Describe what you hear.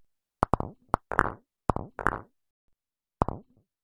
Sound effects > Other mechanisms, engines, machines
Lawn Bowls Clearing
This is a fabrication of the sound created when clearing the bowls and the end of a set. The bowls are often pushed with the players feet so that they may be reset to switch ends. This mix was made with Audacity from a variety of sources including recordings of snooker and billiard balls.
Bowls, Lawn, Percussive, Sport